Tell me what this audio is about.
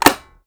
Sound effects > Objects / House appliances
OBJCont-Blue Snowball Microphone, CU Jewelry Box, Close Nicholas Judy TDC
A jewelry box close.